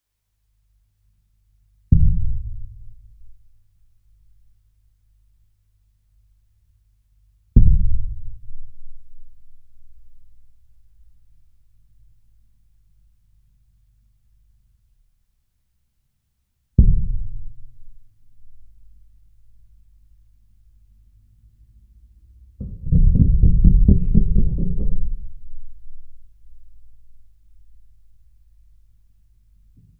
Sound effects > Other mechanisms, engines, machines
Tapping and strumming a corrugated metal suburban garage door.